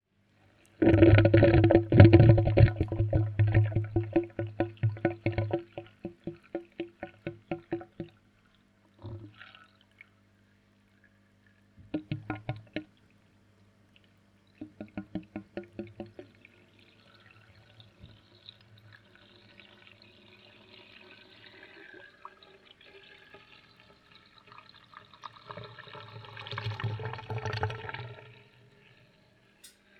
Sound effects > Objects / House appliances
Washing machine drain sound - water draininig
Recorder was over the plug that the washing machine drains to. You can hear water filling the pipes and then gurgling as it empties.